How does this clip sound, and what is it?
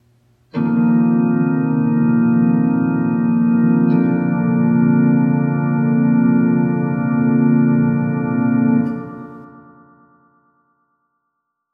Music > Solo instrument
organ chord - 120 bpm
A sustained chord that then resolves for an “amen” feeling. Created with a vintage Casio keyboard using the drawbar organ tone. Recorded on an iPhone and edited with BandLab to give it an ambient, echoing effect.
organ, music, chord, spiritual